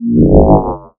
Instrument samples > Synths / Electronic
DISINTEGRATE 1 Bb
additive-synthesis, bass, fm-synthesis